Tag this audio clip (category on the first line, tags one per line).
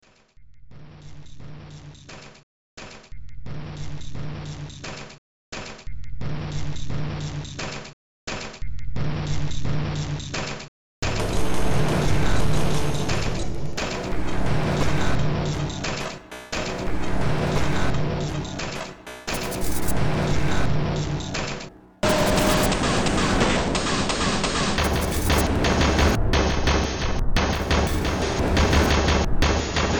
Music > Multiple instruments
Ambient
Soundtrack
Underground
Games
Cyberpunk
Industrial
Noise
Horror
Sci-fi